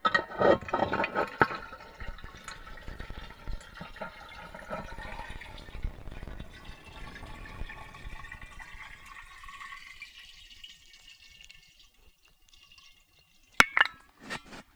Sound effects > Experimental
contact mic in metal thermos, emptying2
Water being poured out of a thermos recorded with a contact microphone. Somewhat quiet.
contact-mic, contact-microphone, experimental, thermos, water, water-bottle